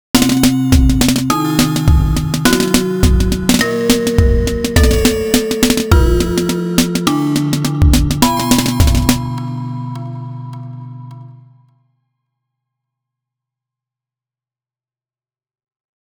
Music > Multiple instruments
drumloop loop beat drums drum bass hip hop hiphop industrial trippy glitch glitchy fx